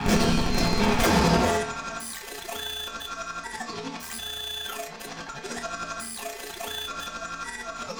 Sound effects > Electronic / Design
Electro-Mechanical Christmas Bells
I finally sat down to explore Native Instruments Absynth sampler feature. I used samples from my, 'Broken Freezer Sample Pack' samples to make these noises. It is a low effort beginner pack. It is for documentation purposes but maybe you can find it useful.
christmas-sound-design, abstract, sound-design, noise, native-instruments-absynth, absynth, Christmas-themed